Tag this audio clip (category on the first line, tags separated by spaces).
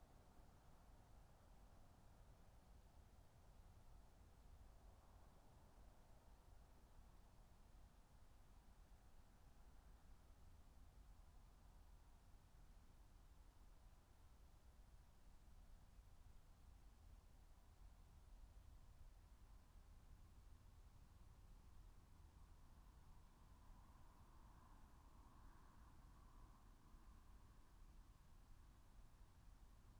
Soundscapes > Nature
soundscape raspberry-pi meadow field-recording alice-holt-forest nature phenological-recording natural-soundscape